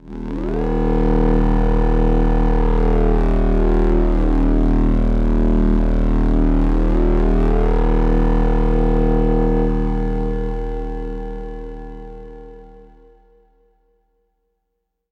Sound effects > Experimental
Analog Bass, Sweeps, and FX-039
alien, analog, analogue, bass, basses, complex, dark, effect, electro, electronic, fx, retro, robot, sample, sci-fi, scifi, sfx, synth, trippy, weird